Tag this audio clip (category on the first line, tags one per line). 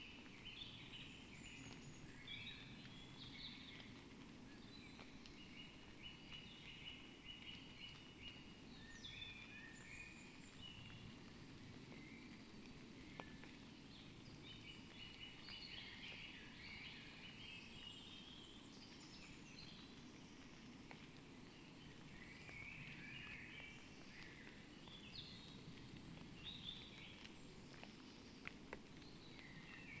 Soundscapes > Nature
phenological-recording
nature
modified-soundscape
sound-installation
soundscape
raspberry-pi
Dendrophone
alice-holt-forest
natural-soundscape
data-to-sound
artistic-intervention
field-recording
weather-data